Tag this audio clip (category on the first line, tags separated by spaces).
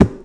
Sound effects > Objects / House appliances
bucket carry clang clatter cleaning container debris drop fill foley household kitchen knock lid liquid metal object plastic pour scoop shake slam spill tip tool water